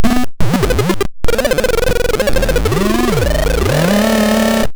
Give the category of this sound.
Sound effects > Electronic / Design